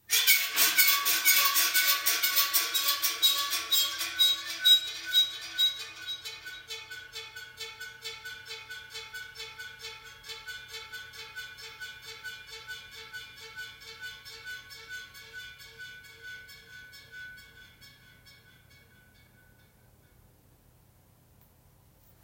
Sound effects > Other mechanisms, engines, machines
Metal spring screech
A spring on a heavy-duty storage trolley dying away. Recorded on an iPhone 12 Pro.